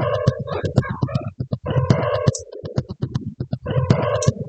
Sound effects > Electronic / Design
Stirring The Rhythms 1
vst, noise-ambient, dark-techno, scifi, PPG-Wave, noise, dark-design, dark-soundscapes, sound-design, science-fiction, horror, mystery, cinematic, drowning, content-creator